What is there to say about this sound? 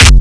Instrument samples > Percussion
BrazilFunk Kick 16 Processed-6-Processed
A easy kick made with 707 kick from flstudio original sample pack, used Waveshaper maxium output to make a crispy punch. Then I layered Grv kick 13 from flstudio original sample pack too. Easily add some OTT and Waveshaper to make it fat. Processed with ZL EQ.
Distorted, Kick